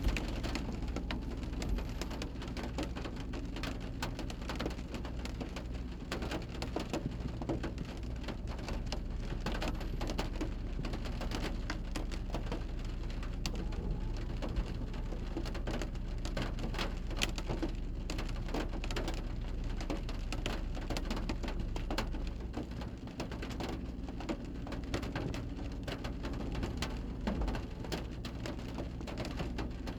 Soundscapes > Indoors
RAINGlas-Samsung Galaxy Smartphone, CU On Car Roof Nicholas Judy TDC
Raining on a car roof.
car, Phone-recording, rain, roof